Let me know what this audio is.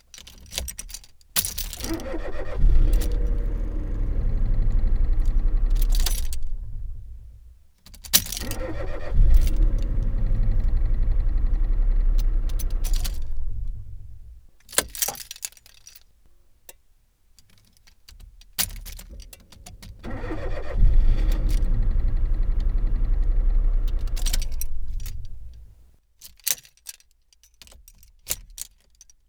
Sound effects > Vehicles
Car Engine Starting/Stopping
The sound of a car engine starting and stopping. Recorded with a 1st Generation DJI Mic and Processed with ocenAudio
car, engine, motor, starting, stopping, vehicle, vroom